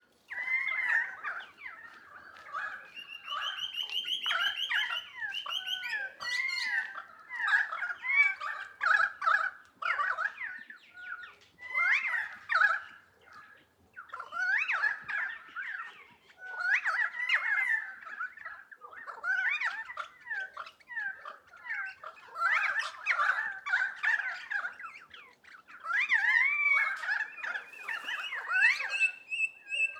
Soundscapes > Nature
Heavily processed recording of birdsong, primarily Currawongs, during mating season (Southern Hemisphere late August) on a warm and sunny day.